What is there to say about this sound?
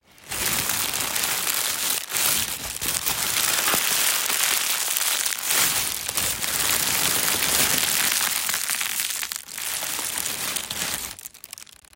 Sound effects > Objects / House appliances
papīrs / paper
Household objects for sound recognition game
household, item